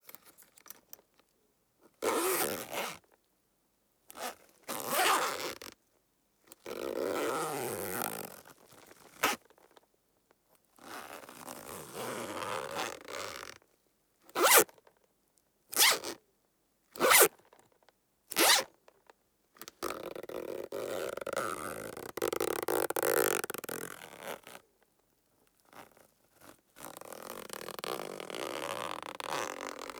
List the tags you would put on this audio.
Sound effects > Objects / House appliances
crack clothes closure fastening suitcase buckle zip clasp bag zipper lock fastener fly whack

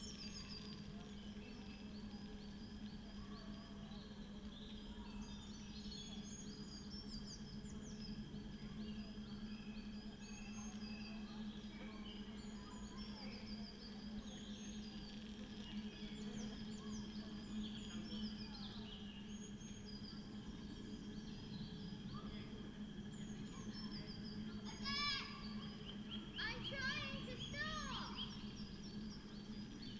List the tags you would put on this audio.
Soundscapes > Nature
alice-holt-forest field-recording nature phenological-recording raspberry-pi weather-data

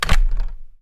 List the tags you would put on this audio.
Sound effects > Objects / House appliances
close wooden

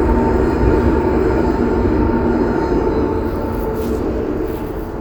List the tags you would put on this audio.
Sound effects > Vehicles
transportation vehicle

